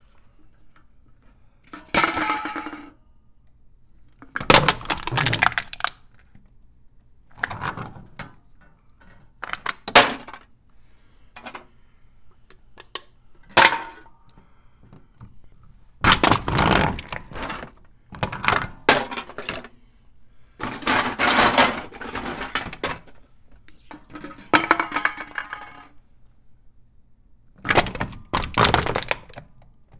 Sound effects > Other mechanisms, engines, machines
A manual lever-operated can crusher with associated sounds. Recorded with a TASCAM DR-05X.